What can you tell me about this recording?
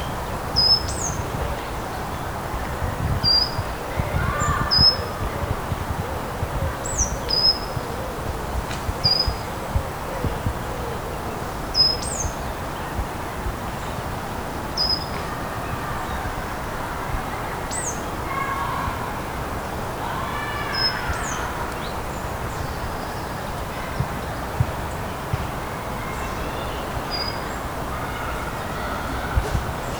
Soundscapes > Nature
Urban Ambience Recording in collab with Narcís Monturiol Institute, Barcelona, March 2025. Using a Zoom H-1 Recorder.
Tudo, Bird